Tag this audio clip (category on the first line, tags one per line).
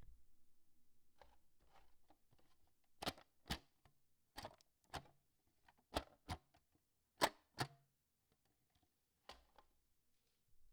Sound effects > Other mechanisms, engines, machines
VHS
buttons
push
plastic